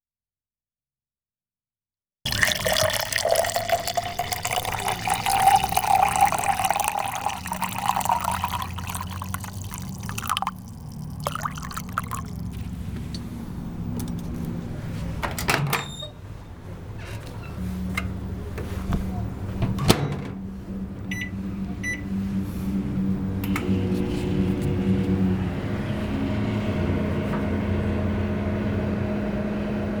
Sound effects > Objects / House appliances
Making microwave coffee in the office facing Main Street. Recorded with SONY ICD UX560F DIGITAL VOICE RECORDER
breakfast, coffee, timelapse